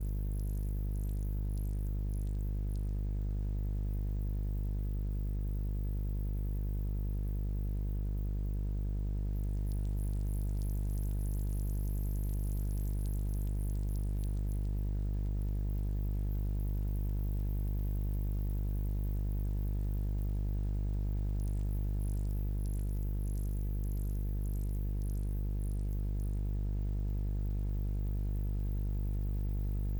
Soundscapes > Indoors
016 Christmas glow ball 5
buzz, electric, electricity, hum, magnetic, piezo